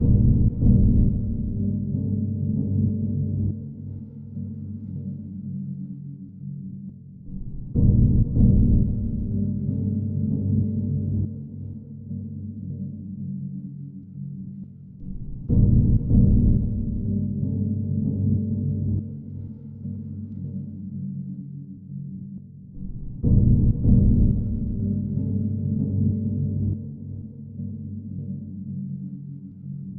Soundscapes > Synthetic / Artificial
This 62bpm Ambient Loop is good for composing Industrial/Electronic/Ambient songs or using as soundtrack to a sci-fi/suspense/horror indie game or short film.
Industrial, Samples, Packs, Loopable, Underground, Alien